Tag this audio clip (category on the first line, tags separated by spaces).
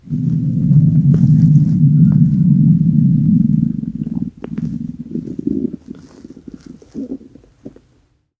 Sound effects > Human sounds and actions
hungry upset growl Phone-recording stomach